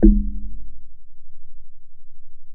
Sound effects > Objects / House appliances
Metal Stand Gong Hit Percussion #003 via Low Frequency Geo Microphone
This sound is produced by hitting the metal stand of my hi-fi amplifier with my hand. I installed a low frequency geo microphone on it and recorded the vibrations. This sound is recorded with a Low Frequency Geo Microphone. This microphone is meant to record low-frequency vibrations. It is suitable for field recording, sound design experiments, music production, Foley applications and more. Frequency range: 28 Hz - >1000 Hz.
metallic, gong, drum, field-recording, hit, impact, metal, percussive